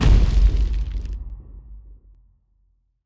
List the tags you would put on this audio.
Sound effects > Electronic / Design
damage-impact
damage-sound
projectile-hit
spaceship-damage
spaceship-damage-sound
spaceship-hit
spaceship-hit-sound
space-shooter-hit
starbase-damage
starbase-damage-sound
starbase-hit
starbase-hit-sound
starship-hit
starship-hit-sound
sustain-damage
take-damage
torpedo-hit
torpedo-hit-sound
torpedo-impact
torpedo-impact-sound

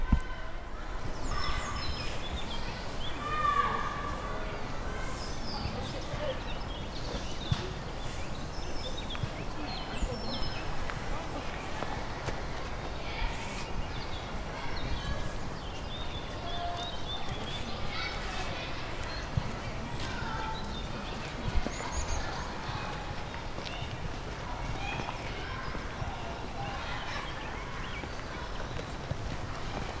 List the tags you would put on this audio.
Soundscapes > Nature
Cadernera
Children
Collserola
Pleasant

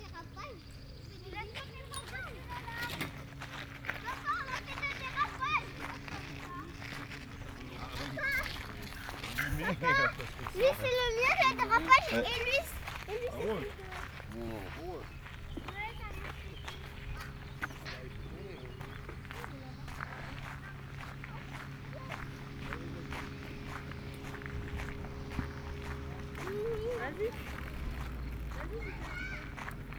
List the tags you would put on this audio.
Soundscapes > Urban
City
Binaural
monday
park
FPV
june
FR-AV2
OKM-1
Soundman
urbain-nature
81000
Tascam
In-ear-microphones
Outdoor
walking
OKM
France
Tarn
walk
Occitanie
2025
OKM1
Albi
ITD

